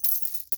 Sound effects > Objects / House appliances
Bag Of Coins
Sound of coins being rustled in a small bag, recorded by Samsung voice notes for a university project
effect, money, sound, coin